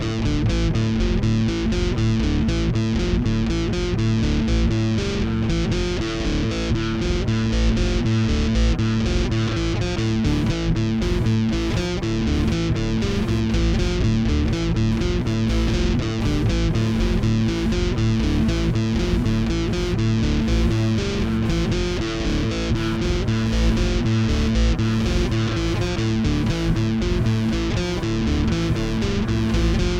Soundscapes > Synthetic / Artificial
Flick Street Mauraders Strike Again And so the headlines read. Somewhere out there, in the night air. The Flick Street Mauraders. A background idea for a podcast, gamers, video works or drama, and play. Composed with a Gibson SG Custom Shop, Cherry Audio ARP 2600. Reaper, and recordings from the Facility. Help ensure that this service remains available for others like yourself. Thank you.